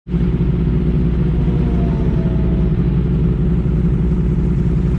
Sound effects > Other mechanisms, engines, machines
clip prätkä (17)
Ducati, Motorcycle, Supersport